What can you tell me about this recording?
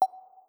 Sound effects > Electronic / Design
Simple or Cute UI / UX / Interface Hover sound
Sound I made for my game - good for interface actions: hover, switch, tab Base sound made with the sfxr plugin and then edited in audacity.
Cute, Hover, Interface, UX, UI, Generic